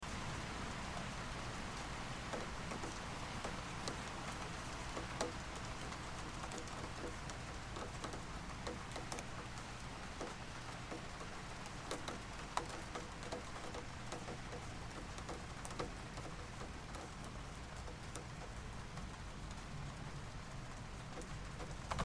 Sound effects > Other
It's raining softly outside and the drops hit the window lightly.